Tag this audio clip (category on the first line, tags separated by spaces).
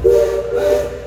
Sound effects > Vehicles
boat steamboat whistle whistling